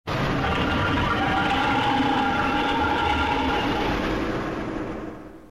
Sound effects > Animals
Recorded myself growling, pitched it down. and then added & edited some acoustic bass sample I did in some beepbox mod I forgot the name of, So yeah, I used an online instrument and my voice for this one.